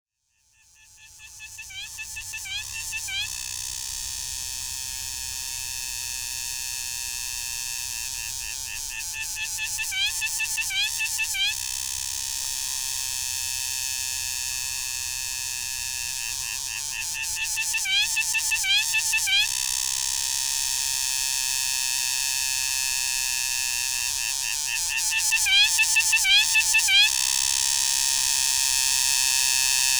Soundscapes > Nature
Recorded in Chiang Rai, Thailand. 2015. With recorder Sony PCM D50 <3.